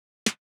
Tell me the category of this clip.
Instrument samples > Percussion